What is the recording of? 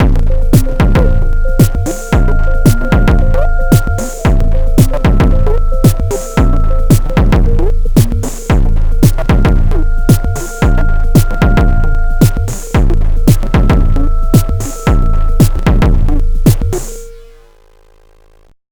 Music > Multiple instruments
drumloop loop beat drums drum bass hip hop hiphop industrial trippy glitch glitchy fx